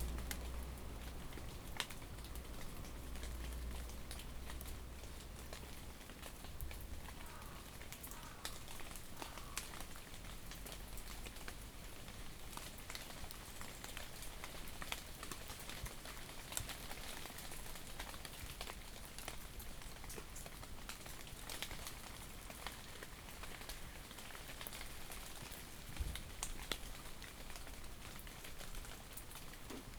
Soundscapes > Nature
250901 06h58 Gergueil Marronnier rue de la fontaine - H2n 150
Subject : Recording the morning church bells in Gergueil from under a big chestnut tree. Date YMD : 2025 September 01 Around 06h58 Location : Gergueil 21410 Bourgogne-Franche-Comté Côte-d'Or France. Hardware : Zoom H2n in MS 150 degree mode. Weather : Slight rain. Processing : Trimmed and normalised in Audacity. Notes : You can hear a bus park near the town hall and leave its engine on. It had more patience than I did. (I was standing in an akward position holding the H2n.)
21410, ambience, bell, bells, Church, Cote-dor, country-side, field-recording, France, Gergueil, H2N, rain, ring, rural, under-tree, Zoom, Zoom-H2N